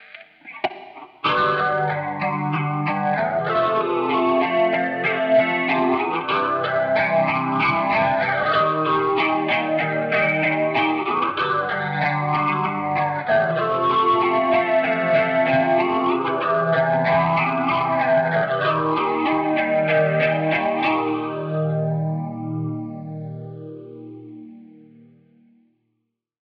Music > Solo instrument
Dream Pop Shoegaze Guitar 190bpm Riff1
Here is a dreamy guitar sample that I record in my home studio having fun with a few guitar toys Guitars: Jazzmaster Fender Mexico, Faim Stratocaster (Argentina) pedalboard: Behringer graphic eq700 Cluster mask5 Nux Horse man Fugu3 Dédalo Electro Harmonix Keys9 Maquina del tiempo Dédalo Shimverb Mooer Larm Efectos Reverb Alu9 Dédalo Boss Phase Shifter Mvave cube baby 🔥This sample is free🔥👽 If you enjoy my work, consider showing your support by grabbing me a coffee (or two)!
deep, dreampop